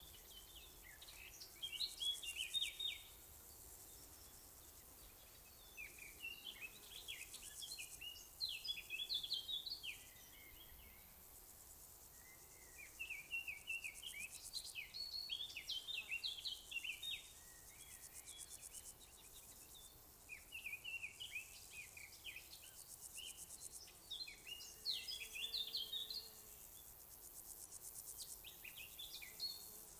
Soundscapes > Nature
Morvan Grillons juin25
Quiet summer forest ambiance near Morvan, Bourgogne, France. Cicadas / crickets and some birds : blackcap, eurasian linnet, chiffchaff. Some light wind and a few bees. 2 x EM272 mics in a kind of AB stereo July 2025
forest, nature, morvan, grillons, summer, orthoptera, cicadas, field-recording, insects, birds, crickets